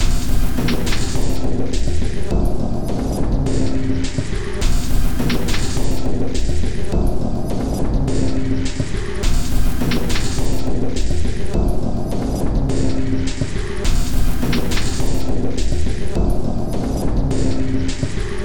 Instrument samples > Percussion
This 104bpm Drum Loop is good for composing Industrial/Electronic/Ambient songs or using as soundtrack to a sci-fi/suspense/horror indie game or short film.
Underground, Drum, Weird, Industrial